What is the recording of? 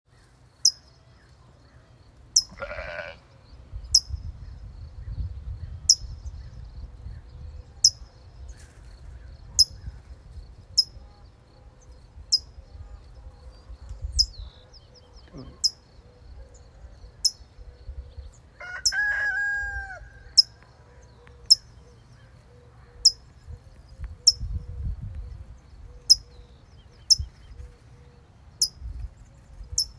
Soundscapes > Nature
Towee in the morning and sheep